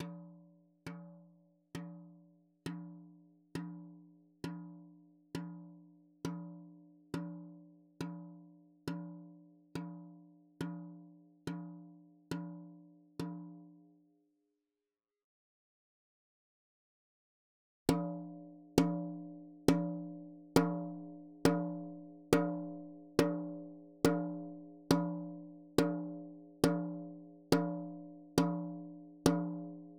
Music > Solo percussion
hi tom-consistent hits soft medium loud for round robbins10 inch by 8 inch Sonor Force 3007 Maple Rack
rimshot, fill, instrument, acoustic, studio, beatloop, hitom, velocity, oneshot, drum, drums, percs, tom, beats, perc, roll, rim, kit, hi-tom, flam, percussion, toms, beat, drumkit, tomdrum